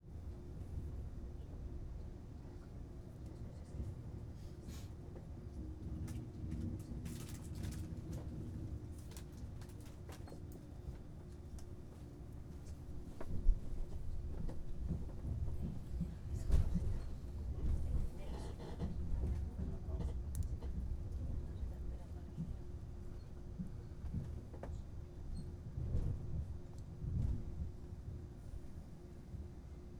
Soundscapes > Indoors
Inside an AVE/TGV train - Dentro de un tren AVE
Interior de un tren AVE durante su salida de la estación y posterior marcha. Sonido de conversaciones lejanas, movimiento del vagón, etc. Editado para eliminar marcas demasiado evidentes que pudieran inutilizar la pista como fondo para cine/podcast. Interior of an AVE train during its departure from the station and subsequent movement. Sound of distant conversations, carriage motion, etc. Edited to remove any overly obvious markers that could render the track unusable as background audio for film/podcast.
trip, ave, wagon, rail, railway, tren, travel, train, viaje, tgv